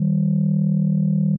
Instrument samples > Synths / Electronic
Landline Phonelike Synth D#4
just-minor-third, Landline, JI-Third, JI-3rd, Tone-Plus-386c, Landline-Telephone-like-Sound, just-minor-3rd, Landline-Telephone, Landline-Phonelike-Synth, JI, Holding-Tone, Synth, Old-School-Telephone, Landline-Holding-Tone, Landline-Phone